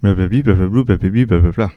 Speech > Solo speech
Subject : Mid 20s male, saying bla bla blah in a french way. Date YMD : 2025 June 14 Location : Albi 81000 Tarn Occitanie France. Hardware : Tascam FR-AV2, Shure SM57 with A2WS windcover Weather : Processing : Trimmed in Audacity.
bla, mid-20s, Tascam, vocal, bli, 20s, male, french, Sm57, A2WS, blou, human, FR-AV2, blah, FRAV2